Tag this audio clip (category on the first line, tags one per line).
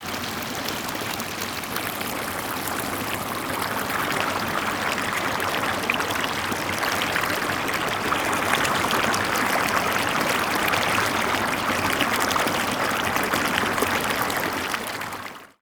Soundscapes > Nature
Bubbles
Flowing
River
splash
Water